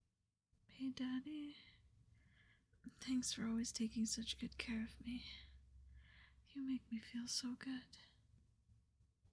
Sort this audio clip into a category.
Speech > Solo speech